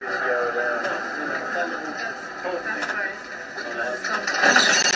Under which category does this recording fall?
Sound effects > Vehicles